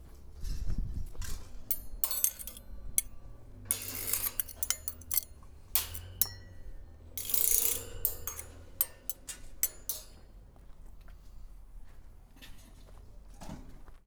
Sound effects > Objects / House appliances
Junkyard Foley and FX Percs (Metal, Clanks, Scrapes, Bangs, Scrap, and Machines) 182
FX, Bang, garbage, scrape, Junkyard, Clang, rattle, trash, Foley, Smash, Ambience, Atmosphere, dumpster, Robot, Environment, rubbish, tube, Clank, Dump, waste, Perc, Metallic, SFX, Robotic, Metal, Machine, Junk, dumping, Bash, Percussion